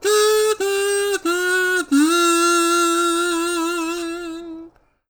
Music > Solo instrument
MUSCInst-Blue Snowball Microphone, CU Kazoo, 'Failure' Accent 01 Nicholas Judy TDC
A kazoo 'failure' accent.